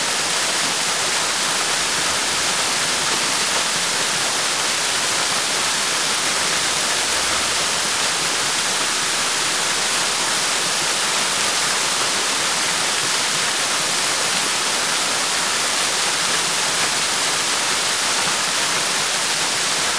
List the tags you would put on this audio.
Soundscapes > Nature
ambience azores environmental fieldrecording flow forest loop natural nature park portugal relaxation ribeiradoscaldeiroes river saomiguel soundscape stereo stream water waterfall

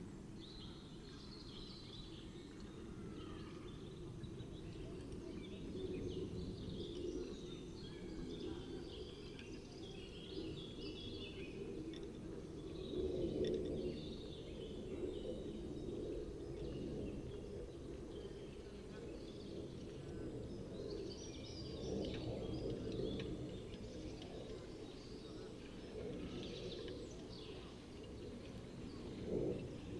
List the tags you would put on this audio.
Soundscapes > Nature
soundscape sound-installation data-to-sound artistic-intervention phenological-recording natural-soundscape weather-data alice-holt-forest Dendrophone modified-soundscape raspberry-pi field-recording nature

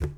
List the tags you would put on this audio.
Sound effects > Objects / House appliances
cleaning drop fill hollow knock slam

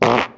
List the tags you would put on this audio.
Sound effects > Other
fart flatulence gas

